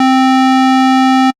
Instrument samples > Synths / Electronic
04. FM-X ODD1 SKIRT6 C3root
FM-X, MODX, Montage, Yamaha